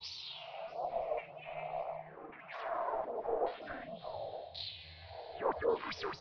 Soundscapes > Synthetic / Artificial
LFO Birdsong 33 2
Description on master track
bird, lfo, massive